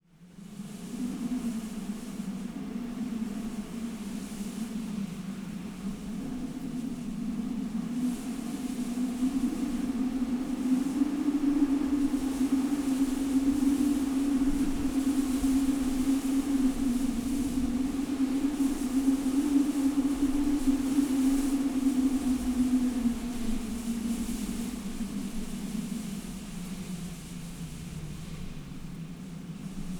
Soundscapes > Nature
Singing electricity cables #2
Intense wind rushing through electricity cables, high up on wooden electricity poles, along with some rustling of leaves from nearby trees, creates an eerie, otherworldly effect. The resulting sound, with its many overtones and varying intensity, brings to mind deserted, misty, haunted landscapes.